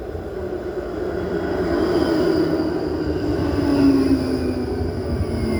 Sound effects > Vehicles
Tram sound in Tampere Hervanta Finland
Tram, Transportation, Vehicle